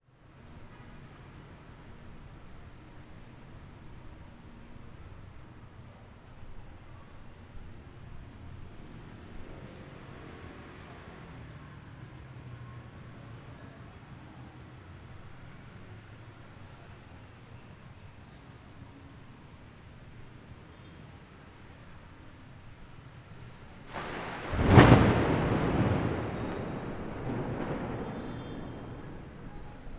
Soundscapes > Urban
250827 182841 PH Traffic and very loud thunderclap in Manila
Traffic and very loud thunderclap in Manila. I made this recording from the entrance of the Mall of Asia, located in Manila, Philippines. One can hear traffic in the wide wet street in front of the mall, and a very loud thunderclap on the left at #0:23. Quite impressive ! Recorded in August 2025 with a Zoom H5studio (built-in XY microphones). Fade in/out applied in Audacity.